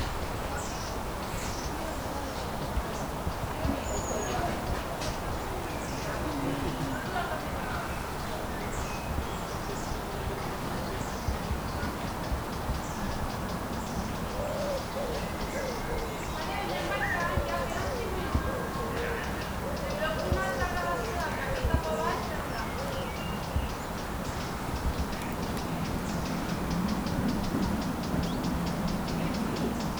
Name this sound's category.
Soundscapes > Nature